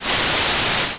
Sound effects > Other
whoosh fx
A 1-second white-noise whoosh with a 0.1 s fade-in and 0.2 s fade-out envelope, ideal for marking section changes or transitions in a mix
cinematic, fx, noise, transition, whoosh